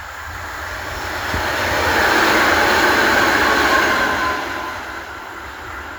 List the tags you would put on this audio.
Soundscapes > Urban
Drive-by Tram field-recording

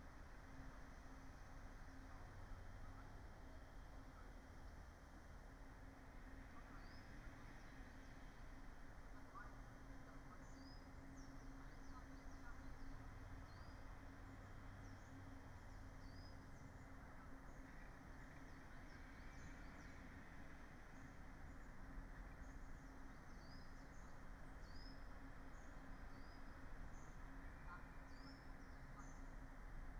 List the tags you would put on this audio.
Soundscapes > Nature
modified-soundscape phenological-recording field-recording natural-soundscape soundscape raspberry-pi artistic-intervention Dendrophone sound-installation data-to-sound alice-holt-forest weather-data nature

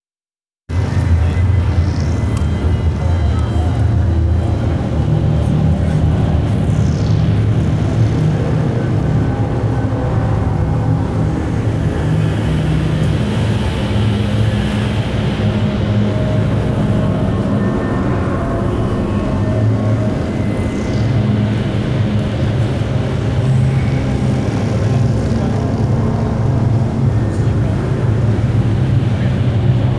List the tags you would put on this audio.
Soundscapes > Urban
street film city ambient

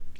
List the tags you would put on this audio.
Sound effects > Objects / House appliances
carton industrial plastic

